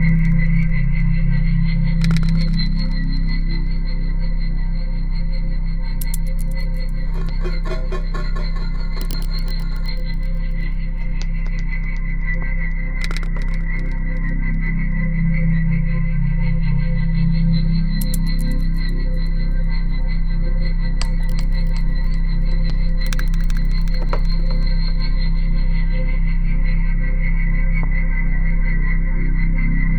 Soundscapes > Synthetic / Artificial
High Frequency Texture with Drone and Foley Elements
High frequency evolving texture composed by a (main) high frequency component and a low-frequency drone.